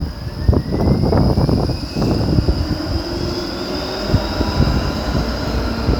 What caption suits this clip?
Sound effects > Vehicles
tram-samsung-3
vehicle, tramway, tram, outside